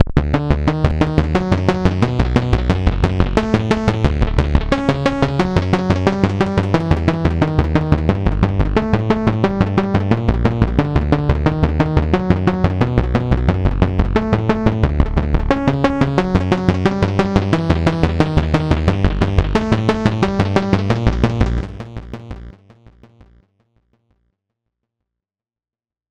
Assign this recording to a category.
Music > Multiple instruments